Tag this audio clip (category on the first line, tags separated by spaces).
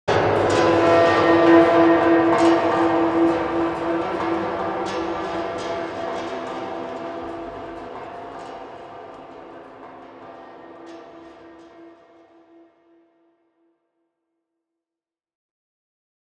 Sound effects > Electronic / Design
Hit Horror spooky Sting